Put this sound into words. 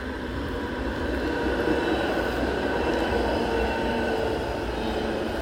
Soundscapes > Urban
Audio of tram passing by. Location is Tampere, Hervanta. Recorded in winter 2025. No snow, wet roads, not windy. Recorded with iPhone 13 mini, using in-built voice memo app.